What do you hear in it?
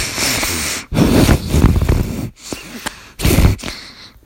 Sound effects > Human sounds and actions
Nariz Congestionada
Sound of a person with a cold and mucous breathing
Breathing, Nose